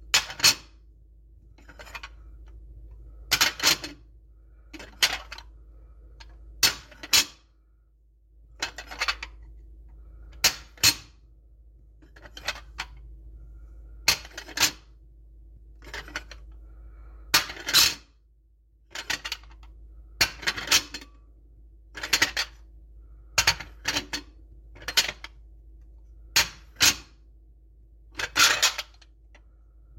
Sound effects > Objects / House appliances
CERMHndl-Samsung Galaxy Smartphone, CU Stack of Plates, Pick Up, Put Down Nicholas Judy TDC
A stack of plates picking up and putting down.
ceramic, foley, Phone-recording, pick-up, plates, put-down, stack